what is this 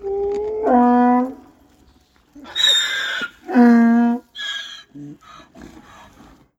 Sound effects > Animals
ANMLHors-Samsung Galaxy Smartphone, CU Donkey, Braying Nicholas Judy TDC
A donkey braying. Recorded at Hanover Pines Christmas Tree Farm.
braying, donkey